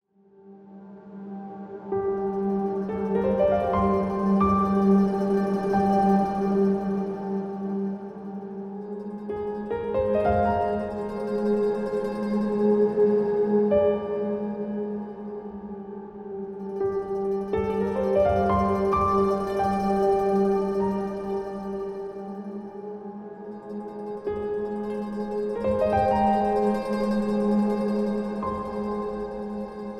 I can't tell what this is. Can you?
Music > Multiple instruments
It makes me think of intense nostalgia or peaceful meditation. But what do YOU hear?